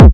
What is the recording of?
Instrument samples > Percussion
BrazilFunk Kick 19
Distorted, BrazilianFunk, Kick, BrazilFunk